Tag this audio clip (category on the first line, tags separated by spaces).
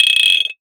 Sound effects > Objects / House appliances
glass mason-jar metal screw